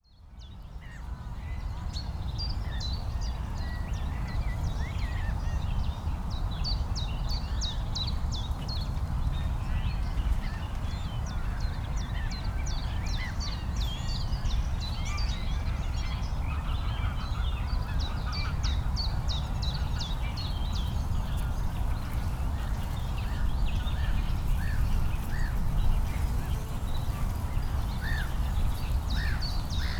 Soundscapes > Nature
An ambience recording at Middleton Lakes, Staffordshire. Day time. Recorded with a Zoom F3 and 2 Em272Z1 Omni directional mics.
recording, nature, birds, ambience, field